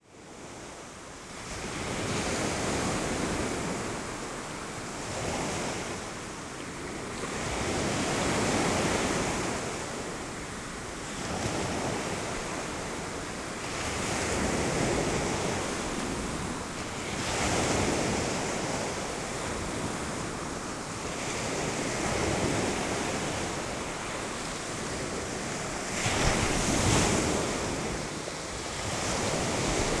Soundscapes > Nature
250815 160340 PH Strong waves at Lagoon beach binaural
Strong waves at Lagoon beach (binaural, please use headset for 3D effects). I made this binaural recording during a windy and wavy day, on a nice small beach located near a place called the Lagoon by the inhabitants of Tingloy island, in Batangas province, Philippines. Hopefully, I found a nice place sheltered from the wind, ideal to record theses strong splashy waves ! Recorded in August 2025 with a Zoom H5studio and Ohrwurm 3D binaural microphones. Fade in/out and high pass filter at 60Hz -6dB/oct applied in Audacity. (If you want to use this sound as a mono audio file, you may have to delete one channel to avoid phase issues).
splashing, ocean, seaside, binaural, tingloy, splash, coast, surfing, ambience, sea, water, shore, soundscape, beach, big, atmosphere, field-recording, Philippines, crashing, surf, waves, strong, island